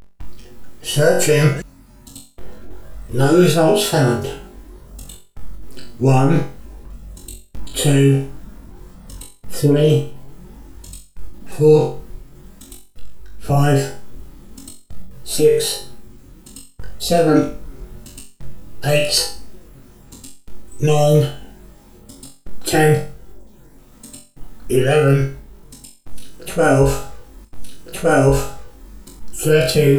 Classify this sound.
Speech > Solo speech